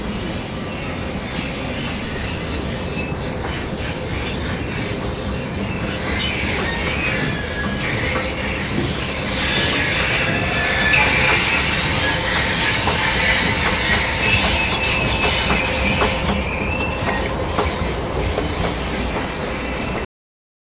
Soundscapes > Urban
Berlin - escalator squeek
I recorded this while visiting Berlin in 2022 on a Zoom field recorder. This is the sound of an escalator at one of the metro stations.